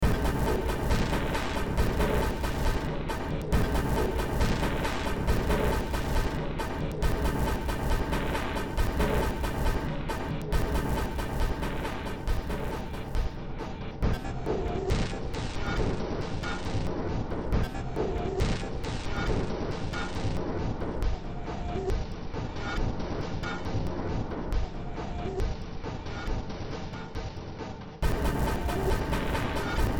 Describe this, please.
Music > Multiple instruments
Short Track #3901 (Industraumatic)
Soundtrack, Sci-fi, Noise, Games, Cyberpunk, Horror, Industrial, Ambient, Underground